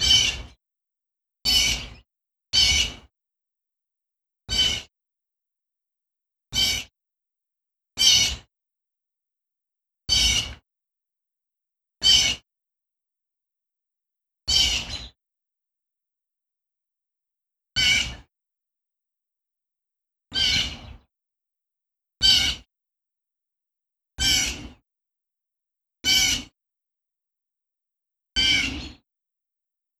Sound effects > Animals
A blue jay calling and crying with some birds at some takes.